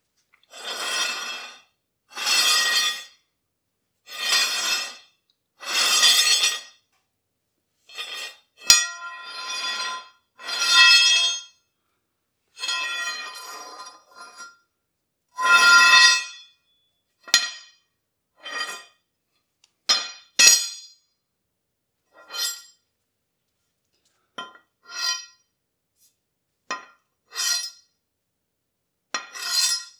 Sound effects > Other
Sword and Blade Sounds
Various swords scraping, clashing, clanging, falling.
clang, clatter, dagger, fall, knife, metal, scrape, steel, sword